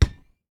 Instrument samples > Percussion
Nova Hoops Full Thick Punchy Basketball Basket Ball Dribble Hooping Flanger Mono 3 - Nova Sound
Immerse yourself in the authentic ambiance of basketball and sports with over 700 meticulously crafted sound effects and percussion elements. From the rhythmic dribbles and graceful swishes to the electrifying shouts and spirited chants, this sound kit covers the full spectrum of the game. Feel the bounce. Hear the game.